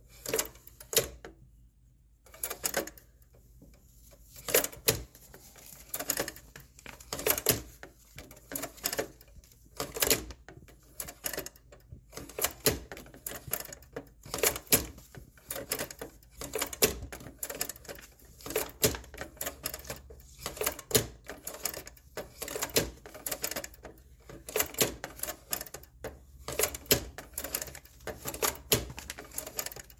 Sound effects > Other mechanisms, engines, machines
Slide projector changes.